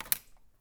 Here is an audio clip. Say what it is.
Sound effects > Other mechanisms, engines, machines
metal shop foley -192
wood, pop, crackle, rustle, bang, shop, foley, fx, tools, bop, tink, knock, oneshot, boom, perc, metal, thud, bam, strike, little, percussion, sfx, sound